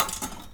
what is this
Sound effects > Other mechanisms, engines, machines
metal shop foley -169
bam, bang, boom, bop, crackle, foley, fx, knock, little, metal, oneshot, perc, percussion, pop, rustle, sfx, shop, sound, strike, thud, tink, tools, wood